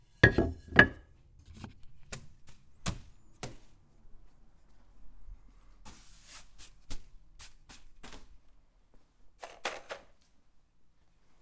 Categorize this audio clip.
Sound effects > Objects / House appliances